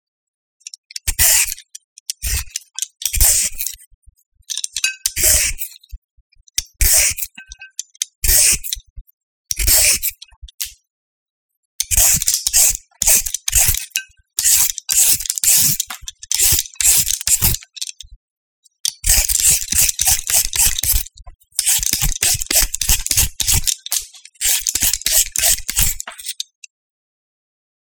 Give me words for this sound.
Sound effects > Objects / House appliances
carrot-peeling-slow-medium-fast
Carrot being peeled at three different speeds - slow, medium and fast. Recorded with Zoom H6 and SGH-6 Shotgun mic capsule.
carrot, kitchen, peeling, peeler